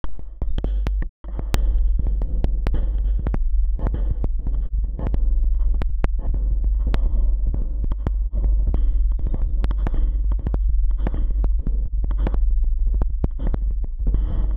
Sound effects > Electronic / Design
Optical Theremin 6 Osc Shaper Infiltrated-024
Alien, Analog, Chaotic, Crazy, DIY, EDM, Electro, Electronic, Experimental, FX, Gliltch, IDM, Impulse, Loopable, Machine, Mechanical, Noise, Oscillator, Otherworldly, Pulse, Robot, Robotic, Saw, SFX, strange, Synth, Theremin, Tone, Weird